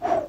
Sound effects > Natural elements and explosions
Whoosh away
A whoosh of air moving away from the listener
blow, wind, air